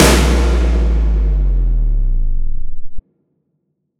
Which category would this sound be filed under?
Sound effects > Electronic / Design